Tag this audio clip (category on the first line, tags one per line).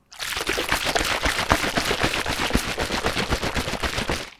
Soundscapes > Indoors
mixing
shaker
powder
H1n
MovoX1mini
water
shaking
drink
instant